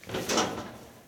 Sound effects > Objects / House appliances
Pool door opening. Recorded with my phone.
opening, open